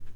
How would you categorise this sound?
Sound effects > Objects / House appliances